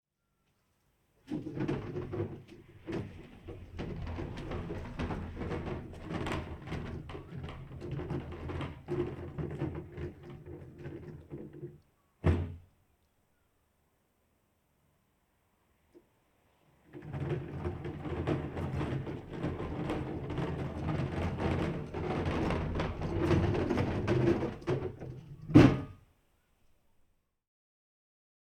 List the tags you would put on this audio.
Sound effects > Objects / House appliances
bin garbage rubbish wheel wheeling

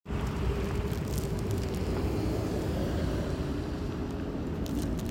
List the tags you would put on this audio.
Sound effects > Vehicles
car automobile

